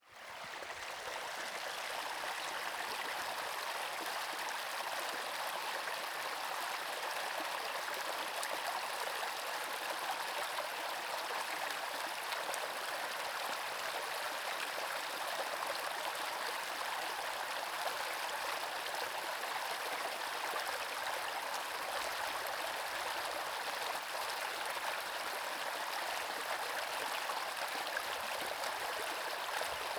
Soundscapes > Nature
Babbling brook 2
The sound of a stream in northern Sweden flowing quite heavily.
brook, nature, relaxing